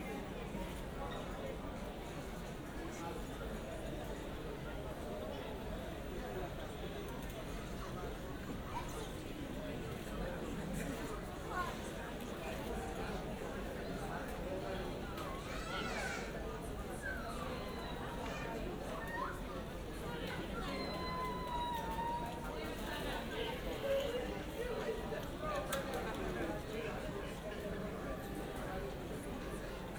Soundscapes > Indoors
AMB - Frankfurt Airport, Gate B44 - 21Jun2025,2106H
Ambience of Frankfurt airport terminal gate B44, recorded with Shure Motiv 88 microphone connected to iphone.
Airport, AMB, Announcement, Frankfurt, Germany, People, Walla